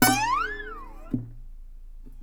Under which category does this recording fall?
Music > Solo instrument